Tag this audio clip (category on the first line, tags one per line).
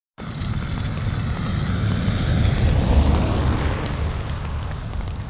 Soundscapes > Urban
tires
passing
studded
Car